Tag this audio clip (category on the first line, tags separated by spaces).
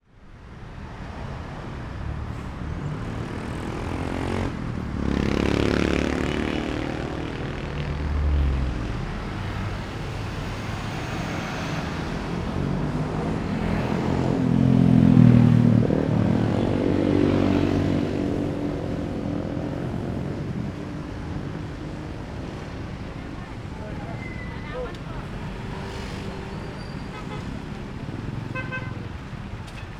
Soundscapes > Urban
soundscape engines honking horn traffic jeepney Philippines atmosphere highway motorcycle cars field-recording road vehicles trucks engine Santo-Tomas voices ambience motorcycles suburban car people